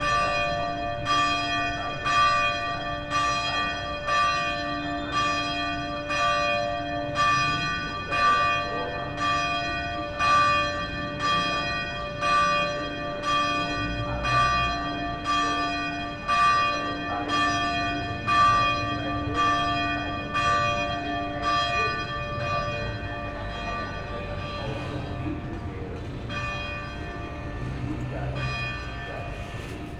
Soundscapes > Urban
250810 060136 PH Church bell ringing for early mass in Balayan
Church-bell ringing for early Mass in Balayan. I made this recording at about 6AM, in front of the old church of balayan (Immaculate Conception Parish Church), in Batangas province, Philippines. In the background, the atmosphere of the small town, with traffic and people talking. Recorded in August 2025 with a Zoom H5studio (built-in XY microphones). Fade in/out applied in Audacity.
ambience, atmosphere, Balayan, bell, church, church-bell, field-recording, honking, horn, Mass, morning, people, Philippines, soundscape, town, traffic, urban, vehicles, voices